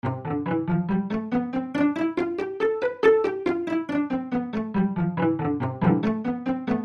Music > Solo instrument
Violin Strums 3
strums, guitar